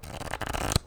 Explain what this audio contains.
Sound effects > Objects / House appliances
GAMEMisc-Blue Snowball Microphone Cards, Shuffle 01 Nicholas Judy TDC
Cards being shuffled.
Blue-Snowball, cards, foley, shuffle